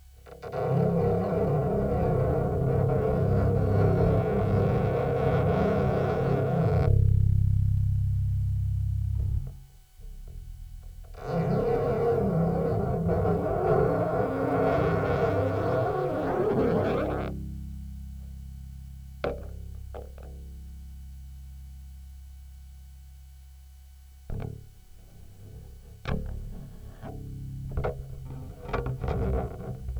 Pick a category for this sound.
Sound effects > Experimental